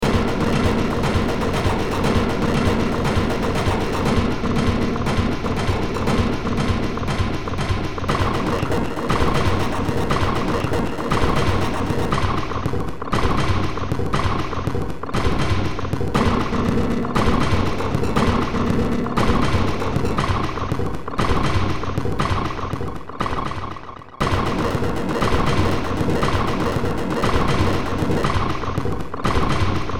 Music > Multiple instruments
Short Track #3423 (Industraumatic)
Horror, Games, Soundtrack, Ambient, Cyberpunk, Industrial, Underground, Sci-fi, Noise